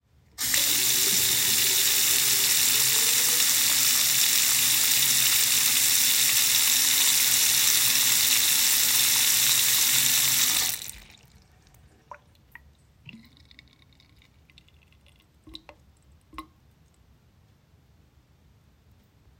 Sound effects > Objects / House appliances
Sink Faucet Water Run 1
Bathroom sink faucet turned on and off, with water draining
drain, faucet, sink, water